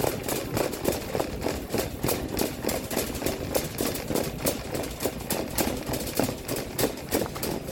Sound effects > Objects / House appliances
Shopping cart wheels rolling. Recorded at Lowe's.